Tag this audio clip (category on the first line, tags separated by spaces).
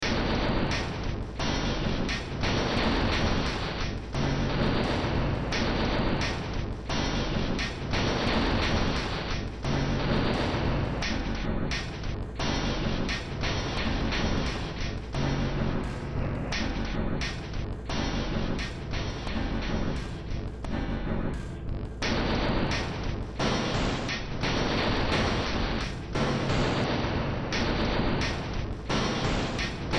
Music > Multiple instruments
Ambient Horror Noise